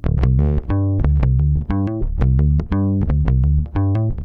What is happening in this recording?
Music > Solo instrument
blues funk rock riff 2
chords, basslines, electricbass, bass, riff, blues, slide, riffs, electric, fuzz, bassline, harmonic, chuny, pluck, rock, low, slap, notes, harmonics, pick, funk, slides, note, lowend